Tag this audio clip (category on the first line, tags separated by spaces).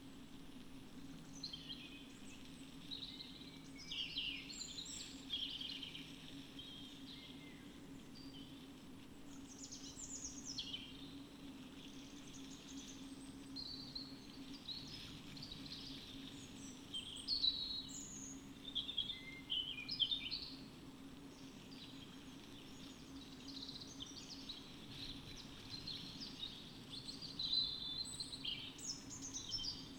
Soundscapes > Nature
natural-soundscape nature sound-installation soundscape raspberry-pi modified-soundscape Dendrophone field-recording alice-holt-forest artistic-intervention data-to-sound phenological-recording weather-data